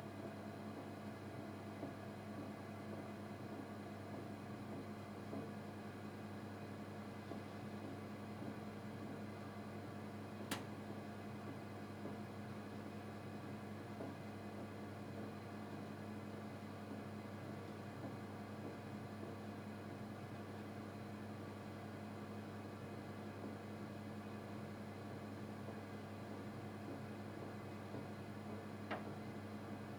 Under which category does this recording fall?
Sound effects > Objects / House appliances